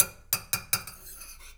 Sound effects > Objects / House appliances
knife and metal beam vibrations clicks dings and sfx-126
SFX, Trippy, FX, Perc, Vibration, Wobble, Clang, Klang, ding, metallic, ting, Beam